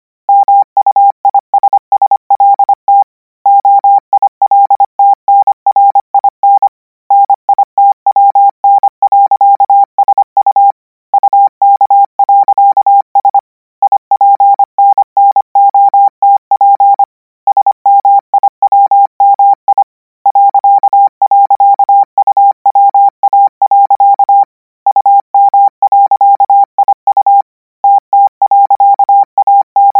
Sound effects > Electronic / Design
Koch 14 KMRSUAPTLOWI.N - 480 N 25WPM 800Hz 90%
Practice hear characters 'KMRSUAPTLOWI.N' use Koch method (after can hear charaters correct 90%, add 1 new character), 480 word random length, 25 word/minute, 800 Hz, 90% volume. um.iu tt.an.ut l poa lratktspp ltl o nmsr.npa .notu kpm allo.u uilnmakrt nirrtr npuni prw ilr..npw wwnstnn npwla wrokli pprtu uoomasmpi sai msin koipuai pris p.lom rwa mkikst kttwii lpawrlm uoupru aara rpl p tkwsk taio. r. wrwskt r.tounrki rtpt kanrri .w.kt .ksmwi k wmaamiilo .l.. kwkioait kp o.kpp oikpttms l mwmo .rrtlal as p aasmpol o k wniipalo msotmk .pkr. srio up sr .iksstt lrwl spt psatsrrku otlsaum tt.w kat lkt uwu. uki tp ntp oioko m lotst niostoplp mim uakikoio. .oo rlwniww .npn m.r.rmpw i. tkp lpkrkni t tu po.a.lai mpw.k .p pwkloi usl trss.pnt. p itaano pism.w iuirwupwt pmmrtta . loupmr r.spwtm rar..lu o ukit.pnti ti ttmnsp nl kprllrsk mwiir r mopnskkrs mmntmuto owikoti tlla u tt aoi w.lmi.o wr.tkt..n utoniuurk .opptk rwn tnr.k i.pskiw o ri..ttr. nolra mtuil rtr sumrpr o.tiouo mututw k.kpur uomlls.
codigo, morse